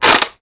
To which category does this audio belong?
Sound effects > Human sounds and actions